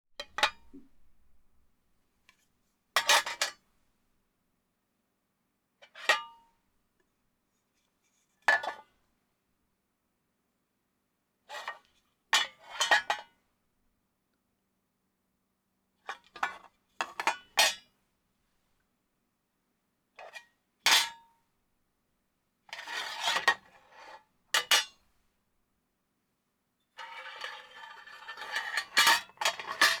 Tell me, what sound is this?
Sound effects > Objects / House appliances
OBJCont Small-Tin3
Metal tin lid on metal tin base contact while sitting on a wooden desktop. Moving, rubbing, scraping and dropping one on the other.